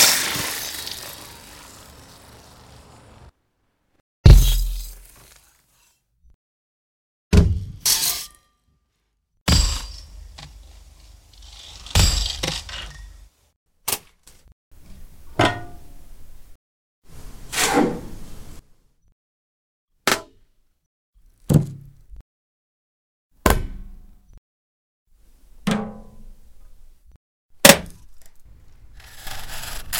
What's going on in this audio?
Sound effects > Human sounds and actions
messy stabber 1
Some supposedly great prompts confuse the program. • Audacity → View → Toolbars → Device Toolbar • select the Audio Host (Windows WASAPI) • choose the correct recording device (Loopback Option) For example, you might see: "Speakers (Realtek High Definition Audio) (loopback)" I increased the volume of each sample separately. I deleted the silent gaps. I increased the volume of the pre-attack and after-decay regions because important events happen there most of the times (not always). • phase difference: introduce 25 ms delay or lead to one channel
stab, homicide, stabber, flesh, massacre, penetration